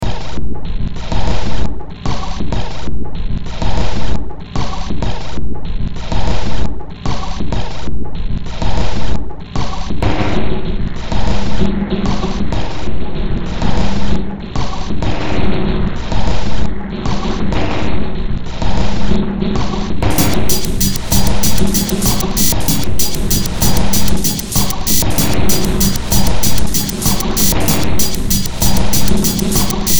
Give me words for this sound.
Music > Multiple instruments
Demo Track #4038 (Industraumatic)
Ambient, Cyberpunk, Games, Horror, Industrial, Noise, Sci-fi, Soundtrack, Underground